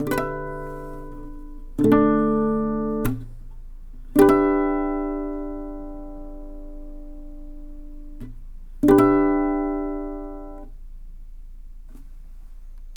Music > Solo instrument
acoustic guitar pretty notes 2
acosutic, chords, dissonant, guitar, instrument, knock, pretty, slap, solo, strings, twang